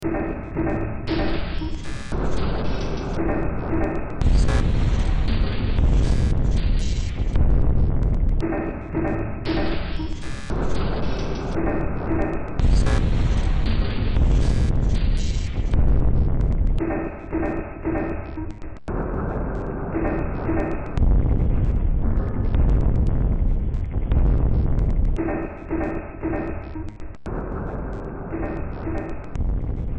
Music > Multiple instruments
Demo Track #3233 (Industraumatic)
Ambient, Games, Horror, Industrial, Noise, Sci-fi, Soundtrack, Underground